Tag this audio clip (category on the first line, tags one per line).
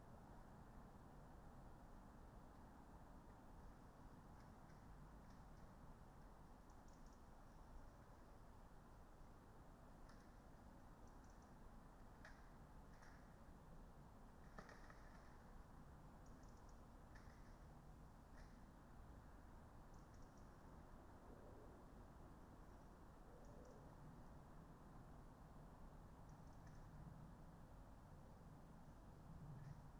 Soundscapes > Nature
soundscape
field-recording
alice-holt-forest
Dendrophone
raspberry-pi
sound-installation
data-to-sound
weather-data
artistic-intervention
nature
modified-soundscape
natural-soundscape
phenological-recording